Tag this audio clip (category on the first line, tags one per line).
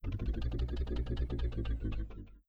Sound effects > Vehicles
Low
Countdown
Decrease